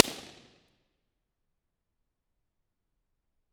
Soundscapes > Other
Subject : An Impulse and response (not just the response) of the Square in Esperaza. Recorded at night. Date YMD : 2025 July 11 at 02h17 Location : Espéraza 11260 Aude France. Recorded with a Superlux ECM 999 and Soundman OKM1 Weather : Processing : Trimmed in Audacity.